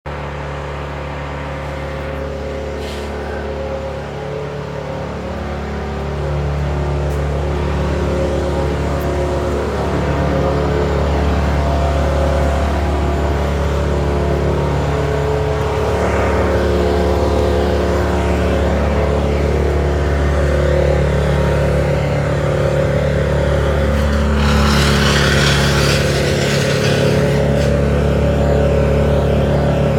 Sound effects > Other mechanisms, engines, machines
woodchipper in use . about 5 minutes processing medium sized brush, branches, small logs. recorded with iphone app.
logging, machinery, MOTOR, woodchipper